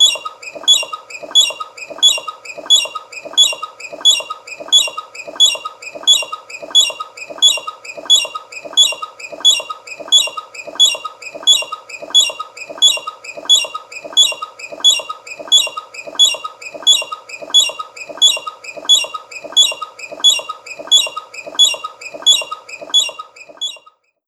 Sound effects > Objects / House appliances
TOONMisc-Samsung Galaxy Smartphone, CU Squeaky Wheels Turning Nicholas Judy TDC
Cartoon squeaky wheels turning.
cartoon, Phone-recording, squeak, squeaky, turn, turning, wheel, wheels